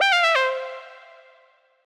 Sound effects > Electronic / Design
Stage Clear
A short sound effect of completing a stage.
audio, arp, pluck, game, soundfx, videogame